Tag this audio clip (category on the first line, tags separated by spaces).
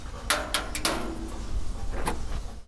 Sound effects > Objects / House appliances
Ambience Atmosphere Bang Bash Clang Clank Dump dumping dumpster Environment Foley FX garbage Junk Junkyard Machine Metal Metallic Perc Percussion rattle Robot Robotic rubbish scrape SFX Smash trash tube waste